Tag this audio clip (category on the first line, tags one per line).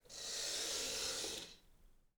Sound effects > Objects / House appliances
curtain
slow
window
opening
speed